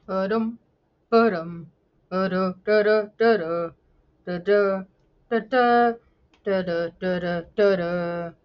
Music > Other
Humming Into My Microphone SFX
I decided to hum a random melody I made up in my head. Use it if you want. I doubt you'll want to though, lmao.